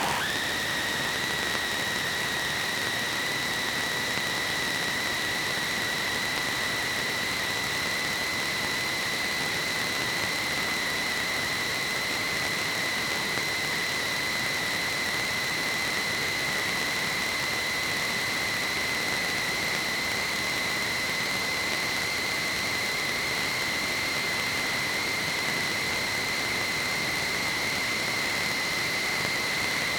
Sound effects > Electronic / Design
Atmospherics captured with Soma and recorded on zoom h2n.